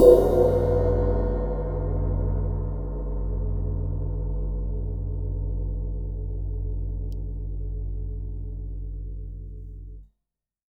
Music > Solo instrument
Zildjian 16 inch Crash-007
Crash, Drums, Kit, Metal, Perc, Percussion, Cymbal, Drum, 16inch, Zildjian, Oneshot, Custom, Cymbals